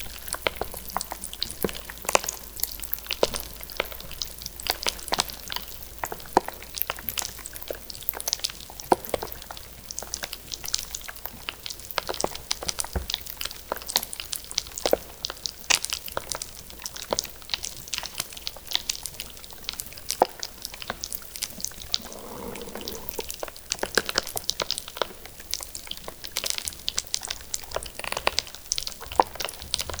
Sound effects > Objects / House appliances
Ice Cubes Melting in Plastic Cup - Contact Mic
Recorded with a Metal Marshmallow Roaster + Morsel contact mic into a Tascam X6 Portacapture. I stuck the mic onto a plastic cup of warm water with a handful of ice cubes melting in it and cranked the gain way up to where even lightly touching the cup could make it clip. Processed with Ableton Live and RX 11 to help it sound a bit cleaner and normalize the volume.